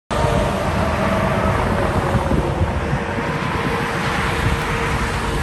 Sound effects > Vehicles
Sun Dec 21 2025 (12)
road, truck